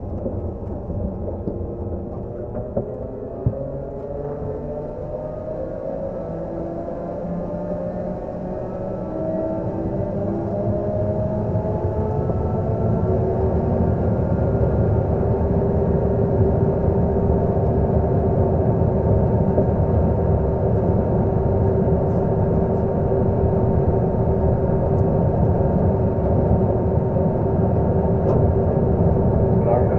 Soundscapes > Synthetic / Artificial
Engine of Fromveur II
The Fromveur II is one of the boats that go to the island of Ushant in Brittany. Recorded with contact microphone in a Zoom H4N Pro
boat, engine, motor, sea